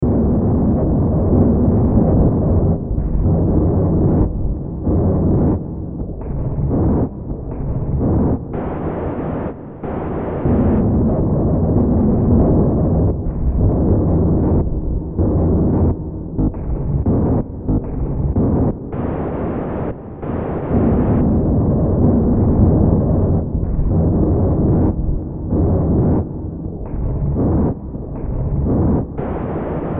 Soundscapes > Synthetic / Artificial
Looppelganger #197 | Dark Ambient Sound
Use this as background to some creepy or horror content.